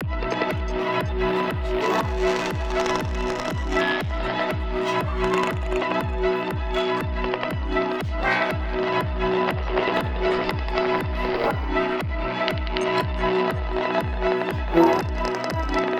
Music > Multiple instruments
Violin and Alien Drum Loop at 120bpm
Violin and aliens with a drum in the background Violin is recorded with Tascam Portacapture X6
120-bpm, 120bpm, alien, beat, Drum, loop, rhythm, violin